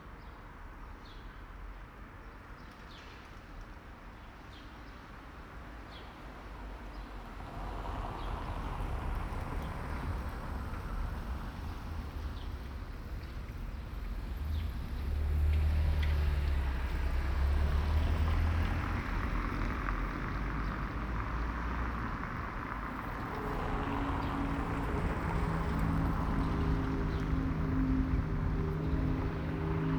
Soundscapes > Urban
250725 08h50 Albi Cathedral SW fountain OKM1
Subject : The drinking fountain SW of the cathedral, facing north to the cathedral. Date YMD : 2025 July 25 Location : Albi 81000 Tarn Occitanie France. Soundman OKM1 Binaural in ear microphones. Weather : Light grey sky (with small pockets of light). A few breezes About 16°c Processing : Trimmed and normalised in Audacity.